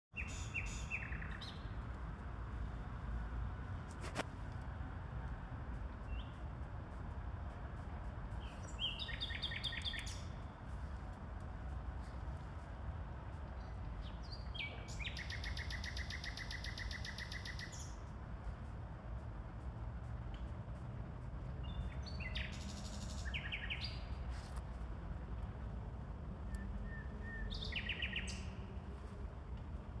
Soundscapes > Nature
Nightingale Singing in a Park, recorded with a phone
forest, spring, Singing, bird, Nightingale, birdsong, nature, vocalize, field-recording